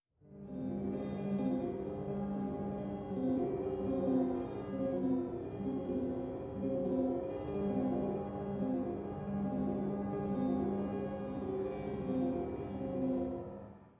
Instrument samples > String
Creepy Guitar Plucks
Plucking an acoustic guitar with heavy added reverb/beat repeat filters.
eerie, fx, plucks, effects, delay, reverb, guitar, acoustic, creepy